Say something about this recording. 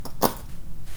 Sound effects > Other mechanisms, engines, machines
Handsaw Oneshot Metal Foley 7
household, handsaw, plank, vibe, shop, smack, foley, fx